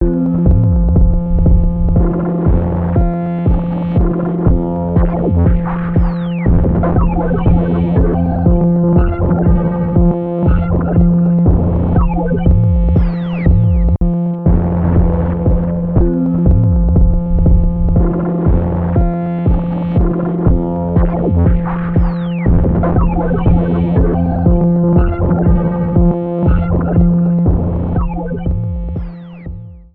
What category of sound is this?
Music > Solo percussion